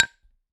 Sound effects > Objects / House appliances
Slate hit 2
Subject : Two slate rocks hitting each other. Date YMD : 2025 04 20 Location : Gergueil France. Hardware : Zoom H2n Mid mic; Weather : Processing : Trimmed and Normalized in Audacity. Maybe some fade in/out.
foley, Pencil, tapping, Zoom